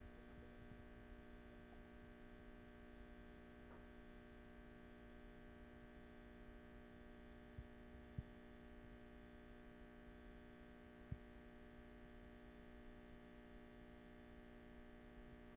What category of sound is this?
Sound effects > Objects / House appliances